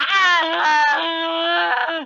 Sound effects > Other
Just Me Screaming.
Funny, Goofy, Scream